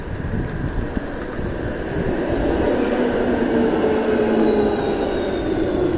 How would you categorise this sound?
Soundscapes > Urban